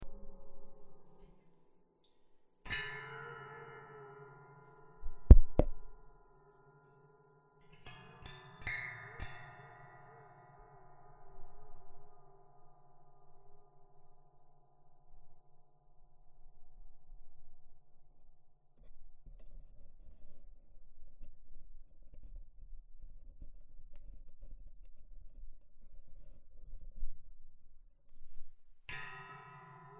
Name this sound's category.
Sound effects > Other